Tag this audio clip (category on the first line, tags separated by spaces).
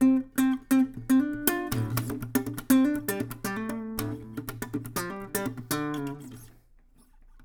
Music > Solo instrument
guitar strings dissonant solo acosutic chords